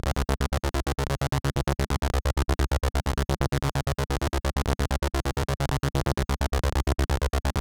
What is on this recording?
Sound effects > Electronic / Design
Clip sound loops 8
8-bit, clip, fx